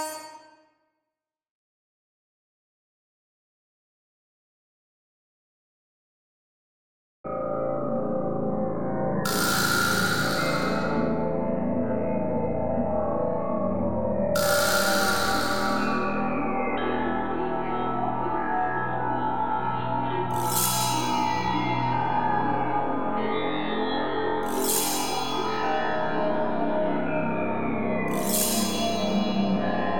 Soundscapes > Synthetic / Artificial
Retro Scifi Lab sounds with semi musical beds.